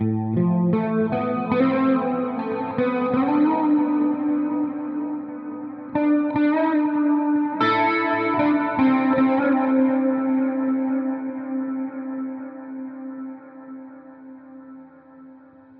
Music > Solo instrument
Smooth Guitar sequence 112 bpm
This sequence is made using a Fender Strato and Amplitube 5. Lot of chorus.
guitar, electric, smooth